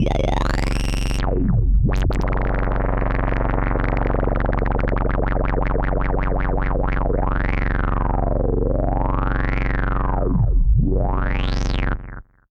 Sound effects > Experimental
Analog Bass, Sweeps, and FX-048
analogue, basses, fx, scifi, sfx, retro, korg, trippy, analog, bass, machine, pad, robot, synth, bassy, sample, sweep, electro, snythesizer, electronic, dark, oneshot, sci-fi, effect, alien, robotic, mechanical, weird, vintage, complex